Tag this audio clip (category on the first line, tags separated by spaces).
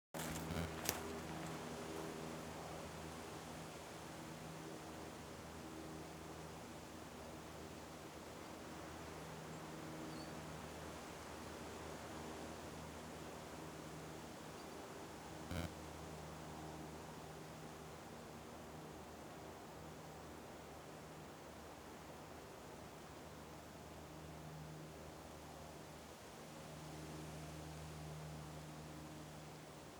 Soundscapes > Nature
Mildura wind soundscape